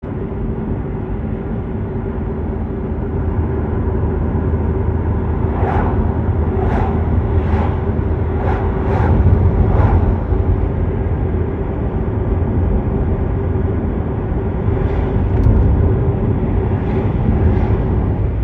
Soundscapes > Urban
A Car Driving While Cars Pass
A car driving while other cars pass. Recorded in Yeovil, Somerset, UK using a Google Pixel 9a phone.
Car, cars, driving, passing, road, roads, street, traffic